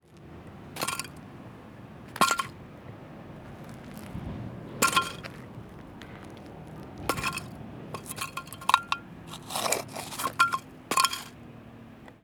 Sound effects > Other
Splott - Rusty Metal Scuffs - Splott Beach Costal Path
fieldrecording, splott, wales